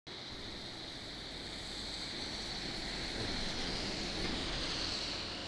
Sound effects > Vehicles
tampere bus19
transportation, bus